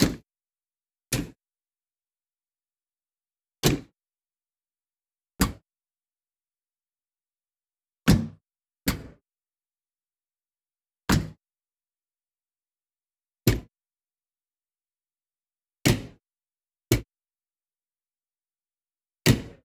Sound effects > Objects / House appliances
DOORAppl-Samsung Galaxy Smartphone, CU Washing Machine Doors, Open, Close Nicholas Judy TDC
Washing machine doors open and close. Recorded at Lowe's.
washing-machine, Phone-recording, open, close, foley, door